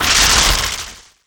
Sound effects > Natural elements and explosions
LoFi FireIgnition-04
Lofi non-explosive ignition sound of a match or gas fire. Foley emulation using wavetable synthesis and noise tables.
match, flame, start, ignite, fire, burn, burning, candle, crackle, stove, activate, ignition